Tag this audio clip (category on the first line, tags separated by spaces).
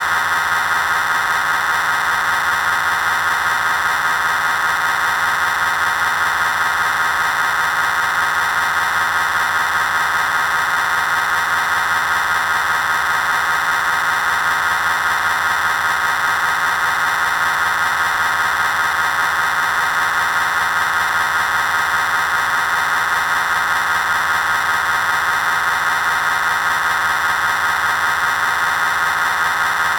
Sound effects > Other mechanisms, engines, machines
IDM Industry Machinery Noise Synthetic Working